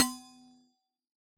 Sound effects > Objects / House appliances
Resonant coffee thermos-001
percusive, recording, sampling